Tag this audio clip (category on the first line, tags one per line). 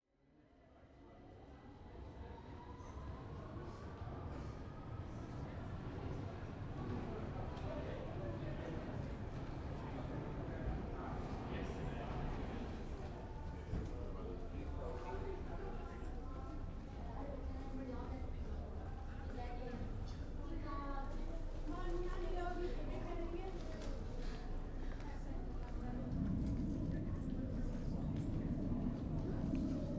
Soundscapes > Indoors
ambience
filed
interior
recording
train
underground